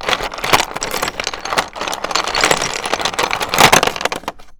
Sound effects > Objects / House appliances
ice cubes movement in tray5
cubes, ice, tray